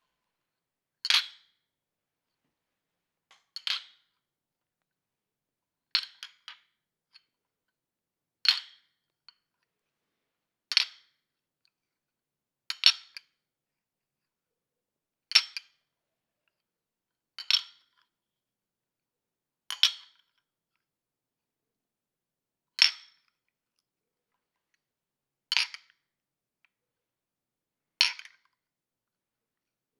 Sound effects > Other
Glasses Clinking
The sound of four shot glasses being clanked together.